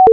Sound effects > Electronic / Design
UV-5RM "down" sfx
created in audacity with sine wave generator, listened to frequency using android app spectroid the radio usually makes this sound when pressing the down key
sine-wave, radio, electronic